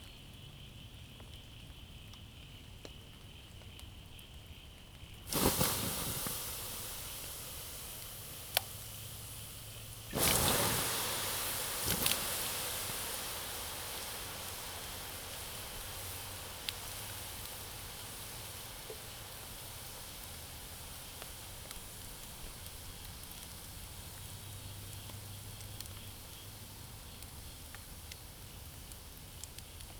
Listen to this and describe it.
Soundscapes > Other
Extinguishing fire at night camping, NSW, Australia
Extinguishing, fire, insects, night, camping